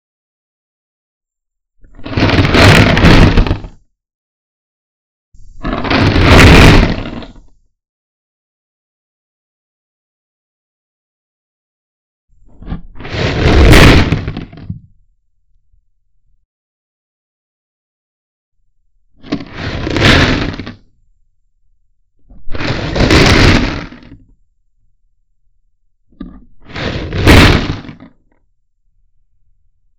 Sound effects > Natural elements and explosions
rock impact shake texture sound 01032026
sound recording of reese's pieces explosive texture sounds.
avatar, rock